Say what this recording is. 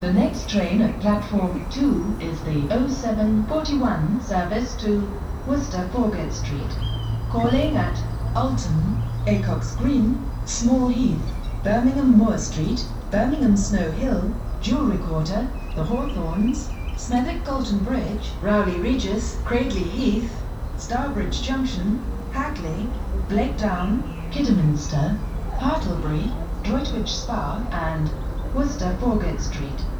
Soundscapes > Urban
A recording of a train station.
ambience, Announcement, filed, outdoors, PA, recording, tannoy, train